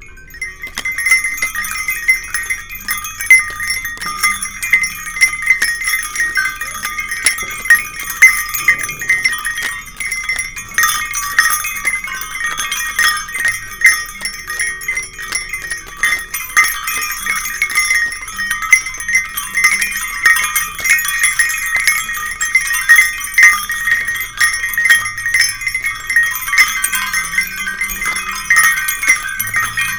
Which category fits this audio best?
Music > Solo percussion